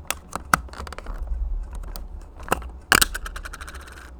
Sound effects > Objects / House appliances
COMCam-Blue Snowball Microphone, CU Canon DL 9000, Focus Lens Cap, Close, Open Nicholas Judy TDC
A Canon DL-9000 focus lens cap closing and opening.
foley, focus, open, Blue-Snowball, close, cap, canon, lens, camera, lens-cap, focus-lens, dl-9000, Blue-brand